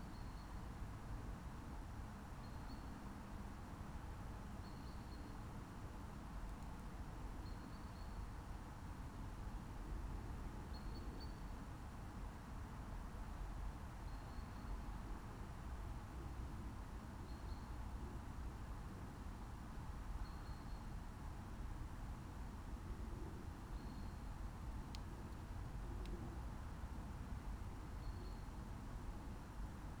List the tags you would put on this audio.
Soundscapes > Nature
phenological-recording
nature
field-recording
meadow
alice-holt-forest
natural-soundscape
raspberry-pi

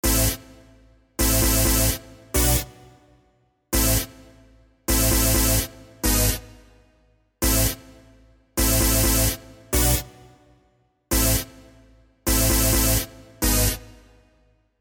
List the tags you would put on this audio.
Music > Solo instrument
130
Drum
Dance
EDM
Drums
Bass
Snare
Slap
Music
Loop
Synth
Electro
Clap
House
bpm
Kick
Free

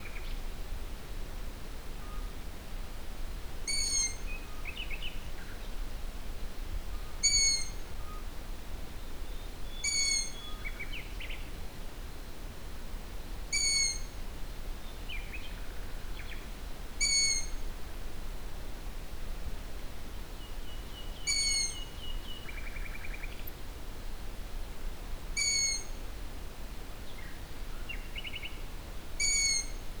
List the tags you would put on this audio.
Soundscapes > Nature
outdoor
owl
grove
night
cricket
bat
field-recording
ambience
Nightingale
nature

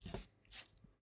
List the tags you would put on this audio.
Sound effects > Other
Chef
Chief
Cooking
Indoor
Kitchen
Slice

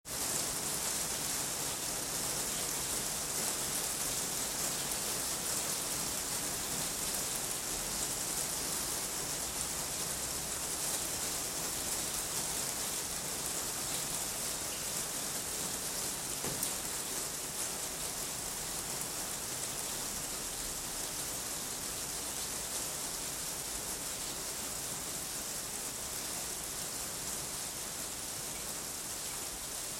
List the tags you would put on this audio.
Sound effects > Natural elements and explosions
ambience
night
rain

